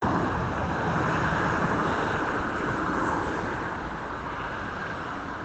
Sound effects > Vehicles
cars passing by near
Multiple cars driving nearby on a busy wet highway road. Recorded in an urban setting in a near-zero temperature, using the default device microphone of a Samsung Galaxy S20+.
car, cars, road, traffic